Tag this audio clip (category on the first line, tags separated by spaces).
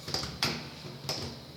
Sound effects > Objects / House appliances
door opening